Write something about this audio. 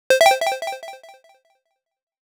Sound effects > Electronic / Design
GAME UI SFX PRACTICE 6
Program : FL Studio, The CS Piky
command, computer, game, interface, machine, sfx, sound, soundeffect, UI